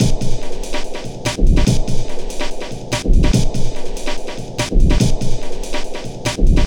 Instrument samples > Percussion
This 144bpm Drum Loop is good for composing Industrial/Electronic/Ambient songs or using as soundtrack to a sci-fi/suspense/horror indie game or short film.
Alien, Ambient, Dark, Drum, Industrial, Loop, Loopable, Packs, Samples, Soundtrack, Underground, Weird